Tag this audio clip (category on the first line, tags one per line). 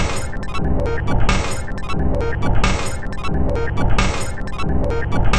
Instrument samples > Percussion
Samples
Weird
Ambient
Packs
Loop
Loopable
Underground
Soundtrack
Drum
Alien
Dark
Industrial